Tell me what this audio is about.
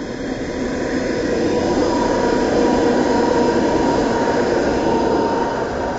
Soundscapes > Urban
Passing Tram 12
A sound of a tram passing by. The sound was recorded from Tampere, next to the tracks on the street. The sound was sampled in a slightly windy afternoon using a phone, Redmi Note 10 Pro. It has been recorded for a course project about sound classification.
outside, city, trolley, field-recording, traffic, tram, street, urban